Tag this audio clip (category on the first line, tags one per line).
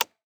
Sound effects > Human sounds and actions
button
activation
switch
off
toggle
click
interface